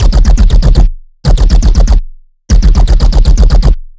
Sound effects > Other
07 - Weaponry - T-25 Energy Pistol A
Synthesis in plasma pistol form.